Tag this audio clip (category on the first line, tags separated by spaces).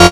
Instrument samples > Synths / Electronic
fm-synthesis additive-synthesis